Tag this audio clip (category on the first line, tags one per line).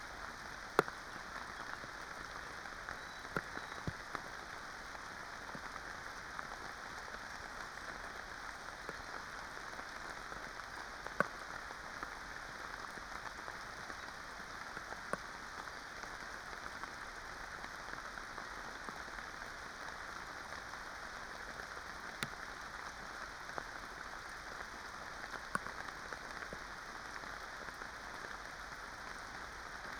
Soundscapes > Nature
modified-soundscape
artistic-intervention
nature
alice-holt-forest
data-to-sound
weather-data
natural-soundscape
Dendrophone
sound-installation
field-recording
phenological-recording
soundscape
raspberry-pi